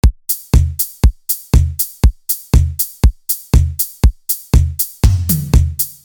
Music > Solo percussion
Ableton Live. VST...........Fury-800.......Drums 120 BPM Free Music Slap House Dance EDM Loop Electro Clap Drums Kick Drum Snare Bass Dance Club Psytrance Drumroll Trance Sample .